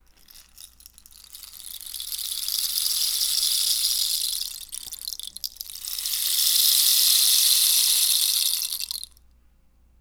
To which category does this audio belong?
Sound effects > Objects / House appliances